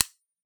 Sound effects > Other mechanisms, engines, machines
Circuit breaker switch-000
foley, click, sampling, percusive, recording